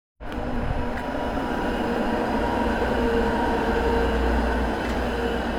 Sound effects > Vehicles
A Tram passes by
A tram passing by. The sound was recorded at Hervanta (Tampere, Finland). The sound was recorded using Google pixel 6a microphone. No extra gear was used and no editing was performed. The sound was recorded for further classification model development, with a goal to classify vehichles by sound.
Tram, Tram-stop